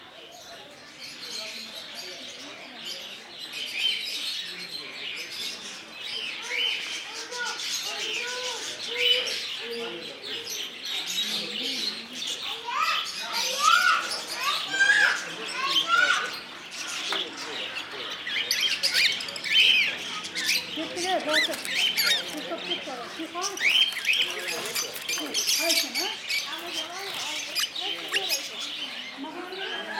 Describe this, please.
Soundscapes > Indoors
28/08/2025 - Tashkent, Uzbekistan Bird market on Tashkent bazaar Zoom H2N
market, birds, livestock